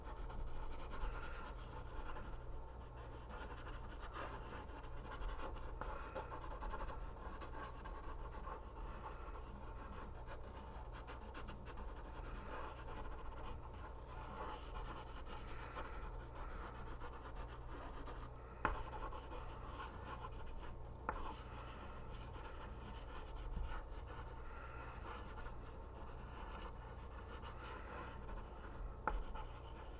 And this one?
Sound effects > Objects / House appliances
A pencil writing.